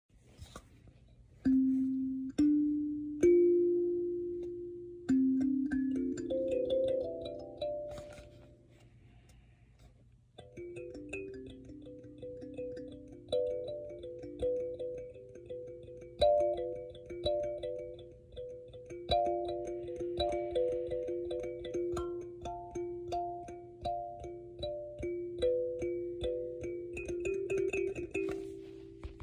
Music > Solo instrument
Moving Forward - Ambient Tongue Drum
Ambient, Outdoor, Free, Field, Dreamscape